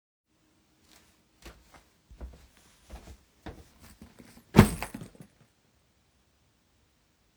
Sound effects > Objects / House appliances
Throwing Suitcase on bed
This is my small suitcase being picked up and thrown on top of my memory foam mattress on my bed at home. It was recorded with a Pixel 9 phone in the recording function.